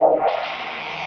Soundscapes > Synthetic / Artificial
LFO Birdsong 1
Description in master track
birds, Lfo, massive